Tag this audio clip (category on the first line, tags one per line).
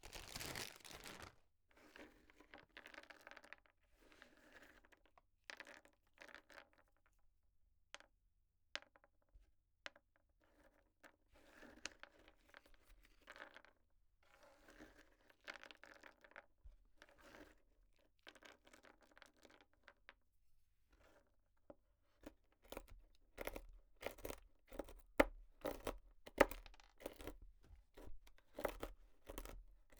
Sound effects > Objects / House appliances
cooking
nuts
slice
food